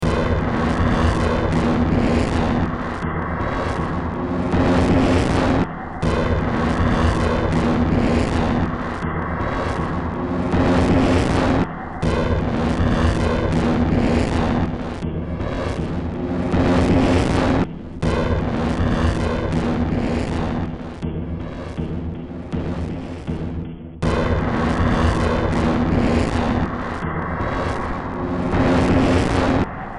Music > Multiple instruments
Demo Track #4037 (Industraumatic)
Underground, Horror, Noise, Soundtrack, Cyberpunk, Sci-fi, Industrial, Ambient, Games